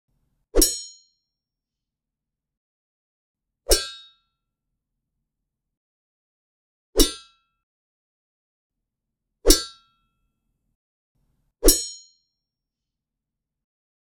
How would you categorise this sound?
Sound effects > Objects / House appliances